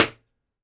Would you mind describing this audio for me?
Soundscapes > Other
I&R-Albi Cellar (Middle mic and balloon)
Subject : Recording a Impulse and response of my home using a omni mic and poping a balloon. Here the Cellar, with the microphone placed in the middle and popping a ballon about 50cm under it. The ceiling is a wooden floor. Date YMD : 2025 July 07 Location : Albi 81000 Tarn Occitanie France. Superlux ECM-999 Weather : Processing : Trimmed, very short fade-in and a fade-out in Audacity, normalised.
cellar, convolution-reverb, ECM-999, ECM999, FR-AV2, home, Impulse, Impulse-and-response, IR, middle-pos, middle-room, Superlux, Tascam